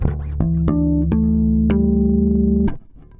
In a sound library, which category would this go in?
Music > Solo instrument